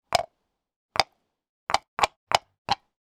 Sound effects > Animals
ANIMAL HORSE TROT HORSESHOE PAVINGSLAB FOLEY COCONUT 01
Sennheiser MKH416 - Horseshoe (Hollow Coconut) on stone. Dry Recording
ANIMAL, FOLEY, HORSE, MOVES, STEPS